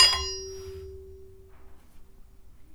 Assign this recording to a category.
Sound effects > Other mechanisms, engines, machines